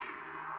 Soundscapes > Synthetic / Artificial
LFO Birdsong 23
birds, massive, lfo